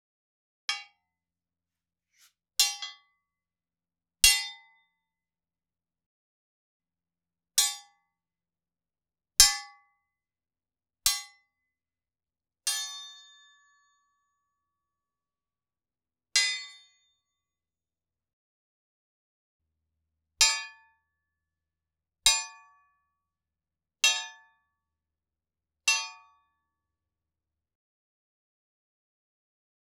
Sound effects > Objects / House appliances
metal crowbar sword like hit w ringout tmnt 2012 potential 06182025
sounds of metal crowbar hit longer metal crowbar hit sound.
attack, battle, blade, combat, crowbar, ding, duel, fight, fighting, hit, impact, karate, knight, kung-fu, martialarts, medieval, melee, metal, metallic, ring, ringing, ringout, samurai, sword, swords, tang, ting, war, weapon, weapons